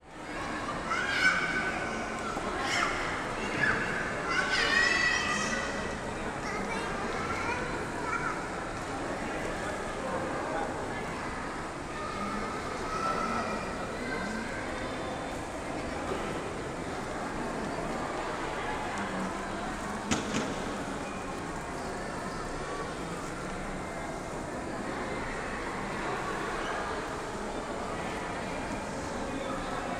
Soundscapes > Other
250803 104406 PH Reverberant church at Saint Padre Pio sanctuary
Reverberant atmosphere in a circular church at Saint Padre Pio Sanctuary (binaural, please use headset for 3D effects). So, one can hear lots of pilgrims and visitors (adults and kids) inside and outside this very reverberant church, as well as birds and cicadas in the trees around. At about #3:07, distant loudspeakers begin to broadcast some prayers, as a Holy Mass is starting in an other place of the sanctuary. Recorded in August 2025 with a Zoom H5studio and Ohrwurm 3D binaural microphones. Fade in/out and high pass filter at 60Hz -6dB/oct applied in Audacity. (If you want to use this sound as a mono audio file, you may have to delete one channel to avoid phase issues).
adults, ambience, atmosphere, binaural, birds, children, church, cicadas, circular, crowd, echo, field-recording, holy, hubbub, kids, men, open, people, Philippines, pilgrims, prayer, reverberant, round, Saint-Padre-Pio, sanctuary, Santo-tomas, soundscape, voices, walla, women